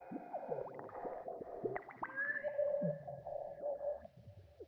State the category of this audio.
Soundscapes > Synthetic / Artificial